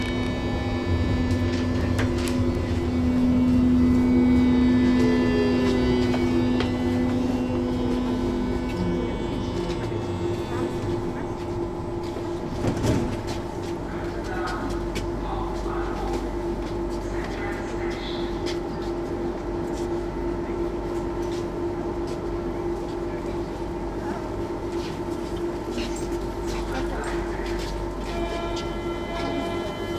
Soundscapes > Urban
Berlin - Metro Zoem 2
I recorded this while visiting Berlin in 2022 on a Zoom field recorder.
fieldrecorder, public-transport, traveling, berlin, germany